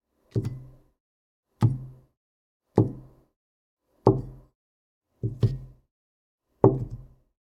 Sound effects > Objects / House appliances
Drink, Glass, Cup, Place On Wood Table
Recorded with a Tascam DR-05X. Thank you!
Drink, Glass